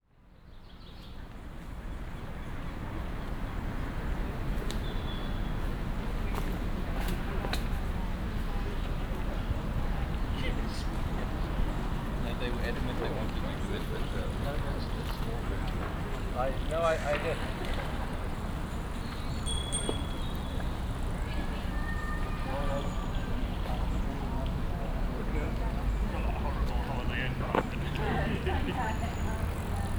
Soundscapes > Nature
Cardiff - Walking From Stone Circle To Otter Statue, Bute Park
City, fieldrecording, Cardiff, Citycentre, urban